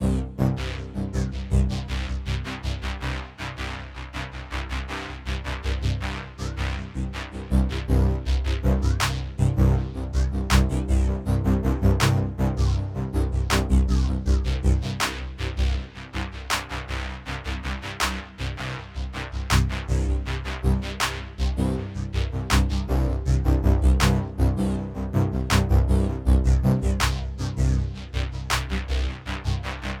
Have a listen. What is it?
Music > Other
Look what i've got for you
Made in FL Studio, can be used in the shop music, instruments (plugins) i used: FLEX, 808 kick, 808 clap, 808 hihat, bpm: 80 (soooooooooo slow) Meow
Music, Drums, Melody, Bass, Flstudio, Beat, Loop, Dance